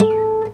Music > Solo instrument
Acoustic Guitar Oneshot Slice 59
acoustic guitar oneshot shorts, knocks, twangs, plucks, notes, chords recorded with sm57 through audiofuse interface, mastered with reaper using fab filter comp
acoustic, chord, foley, fx, guitar, knock, note, notes, oneshot, pluck, plucked, sfx, string, strings, twang